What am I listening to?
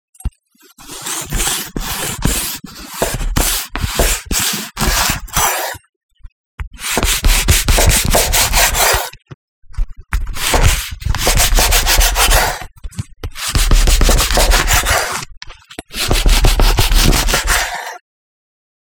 Sound effects > Objects / House appliances
A knife slicing through bread at different three different speeds. Recorded with Zoom H6 and SGH-6 Shotgun mic capsule.